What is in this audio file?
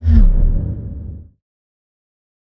Sound effects > Other
Sound Design Elements Whoosh SFX 024
cinematic, effects, production, transition, whoosh